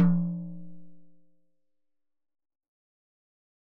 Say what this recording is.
Music > Solo percussion
Hi Tom- Oneshots - 39- 10 inch by 8 inch Sonor Force 3007 Maple Rack
percs, rimshot, acoustic, beats, fill, flam, tom, beatloop, oneshot, tomdrum, toms, velocity, percussion, beat, kit, perc, instrument, hi-tom, drumkit, studio, rim, drum, hitom, roll, drums